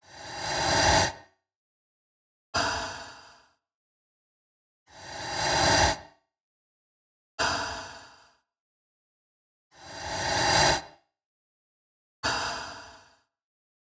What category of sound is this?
Sound effects > Human sounds and actions